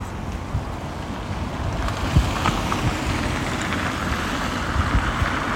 Sound effects > Vehicles
Recording of a car near a roundabout in Hervanta, Tampere, Finland. Recorded with an iPhone 14